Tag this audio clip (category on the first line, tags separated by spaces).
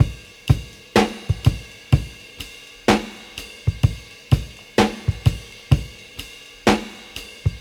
Music > Solo percussion
druml-loop hiphop loop